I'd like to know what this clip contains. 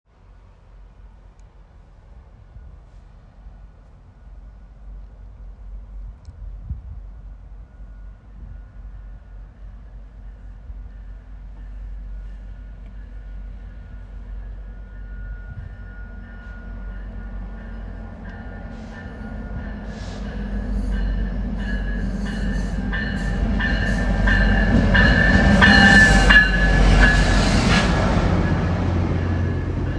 Soundscapes > Urban
Sound effect of a GO Transit train arriving and braking at Guildwood GO Station in Toronto. Recorded with iPhone 14 Pro Max, on April 20 2025.